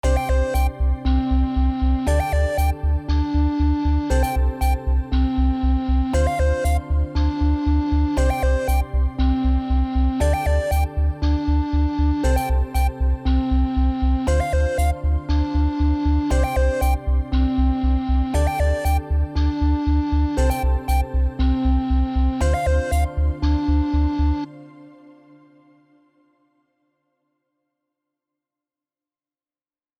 Music > Multiple instruments
Ableton Live. VST.Purity......Musical Composition Free Music Slap House Dance EDM Loop Electro Clap Drums Kick Drum Snare Bass Dance Club Psytrance Drumroll Trance Sample .
Bass, Clap, Composition, Dance, Drum, Drums, EDM, Electro, Free, House, Kick, Loop, Music, Musical, Slap, Snare